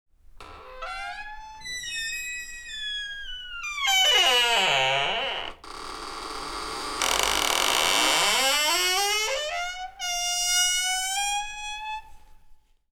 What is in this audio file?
Sound effects > Objects / House appliances
Creaky Door — Dry Metal Hinges (Open & Close, Indoor)
Interior door with extremely dry metal hinges producing sustained creaking sound. #0:00 Door opens slowly with metallic creak (6 seconds). #0:06 Door closes with similar prolonged creaking (7 seconds). The unlubricated hinges create continuous metal-on-metal friction. Recorded indoors at close range. Total duration 13 seconds. Unprocessed recording, edited only for consistent intensity. Perfect for horror, suspense, or abandoned building atmospheres.